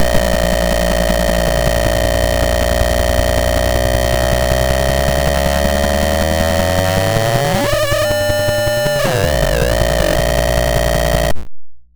Sound effects > Electronic / Design
Optical Theremin 6 Osc dry-037
Glitchy, Spacey, SFX, Electro, Glitch, Synth, Optical, DIY, noisey, FX, Experimental, Theremins, Robot, Theremin, Noise, Sweep, Bass, Instrument, Scifi, Analog, Electronic, Infiltrator, Trippy, Alien, Otherworldly, Digital, Sci-fi, Handmadeelectronic, Dub, Robotic